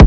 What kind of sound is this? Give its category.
Instrument samples > Synths / Electronic